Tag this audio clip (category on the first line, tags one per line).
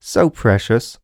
Speech > Solo speech
Male
U67
reaction
Man
Vocal
Mid-20s
NPC
talk
Neumann
singletake
Human
affectionate
Tascam
Single-take
Video-game
dialogue
Voice-acting
FR-AV2
oneshot
voice